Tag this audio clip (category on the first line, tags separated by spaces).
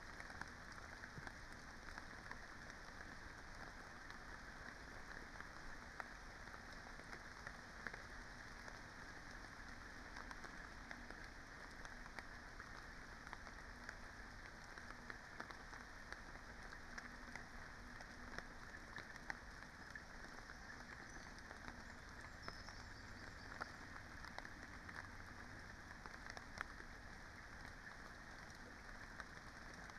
Soundscapes > Nature
sound-installation nature natural-soundscape modified-soundscape raspberry-pi alice-holt-forest Dendrophone artistic-intervention phenological-recording data-to-sound soundscape weather-data field-recording